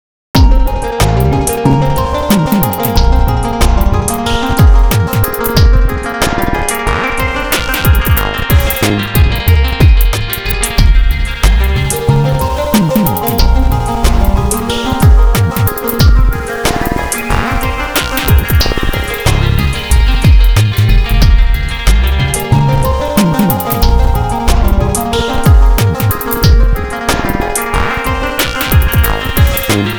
Music > Multiple instruments
new wave industrial glitchy edm idm beats loops patterns percussion melody melodies drumloop bass hip hop
bass, drumloop, edm, glitchy, hop, idm, industrial, loops, melodies, melody, new, patterns, percussion, wave